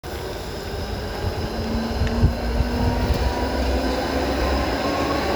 Soundscapes > Urban
A tram passing the recorder in a roundabout. The sound of the tram accelerating can be heard. Recorded on a Samsung Galaxy A54 5G. The recording was made during a windy and rainy afternoon in Tampere.